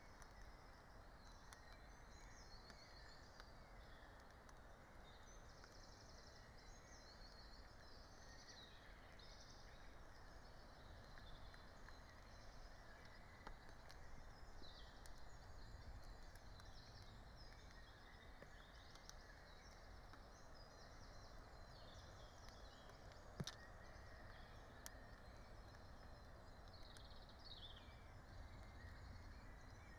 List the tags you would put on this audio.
Soundscapes > Nature
raspberry-pi meadow field-recording nature alice-holt-forest natural-soundscape phenological-recording soundscape

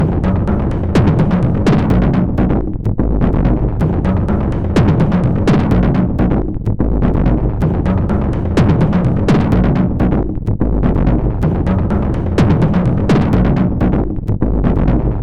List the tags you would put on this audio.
Instrument samples > Percussion
Alien Weird Samples Ambient Dark Packs Underground Industrial Soundtrack Loopable Drum Loop

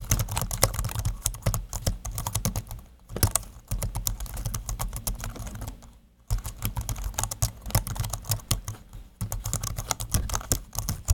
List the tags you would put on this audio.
Sound effects > Other mechanisms, engines, machines
button clicking keyboard computer tech typing press click mouse

